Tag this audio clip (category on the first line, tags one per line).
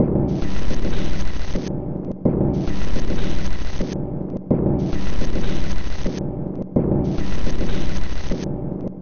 Instrument samples > Percussion
Alien
Ambient
Dark
Loop
Loopable
Packs
Samples
Soundtrack
Underground
Weird